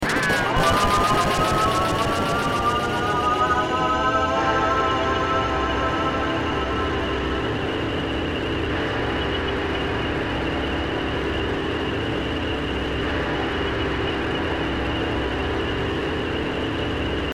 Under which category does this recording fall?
Sound effects > Other